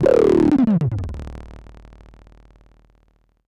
Sound effects > Experimental
Analog Bass, Sweeps, and FX-135
alien, analog, basses, bassy, complex, dark, electro, electronic, fx, korg, machine, pad, retro, robotic, sample, sci-fi, synth, trippy, weird